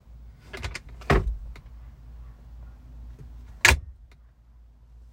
Sound effects > Vehicles
Glove Box Open-Close
Jeep Wrangler Sahara Glove Box is opened and closed.
car, Jeep, glovebox, vehicle